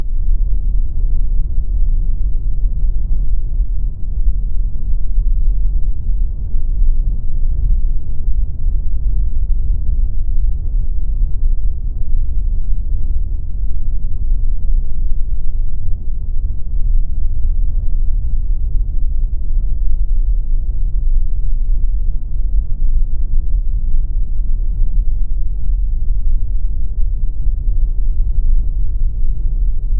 Sound effects > Other mechanisms, engines, machines
Space Flight 6
The rocket's engine would run forever, it seemed.
deep, rattling, vibrations